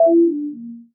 Sound effects > Electronic / Design
Sine Error
A sweet lil ringtone/chime, made in Ableton, processed in Pro Tools. I made this pack one afternoon, using a single sample of a Sine wave from Ableton's Operator, stretching out the waveform, and modulating the pitch transposition envelope.